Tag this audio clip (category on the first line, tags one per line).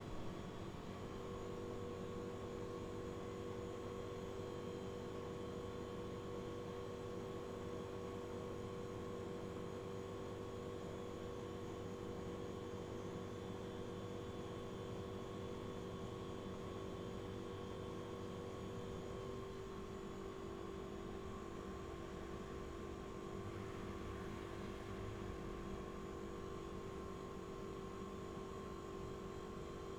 Sound effects > Other mechanisms, engines, machines
AMBIENCE
FRIDGE
SHOP